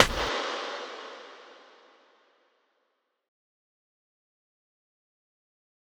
Sound effects > Experimental
snap crack perc fx with vglitchid 0017
percussion, perc, lazer, clap, pop, experimental, impact, alien, zap, whizz, otherworldy, impacts, snap, crack, sfx, laser, glitch, idm, fx, abstract, glitchy, hiphop, edm